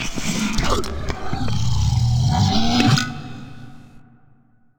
Sound effects > Experimental
Creature Monster Alien Vocal FX (part 2)-002
Alien, bite, Creature, demon, devil, dripping, fx, gross, grotesque, growl, howl, Monster, mouth, otherworldly, Sfx, snarl, weird, zombie